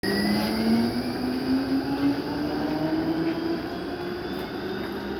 Soundscapes > Urban
Tram moving near station